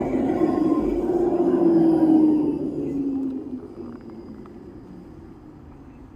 Soundscapes > Urban
final tram 7
finland, hervanta, tram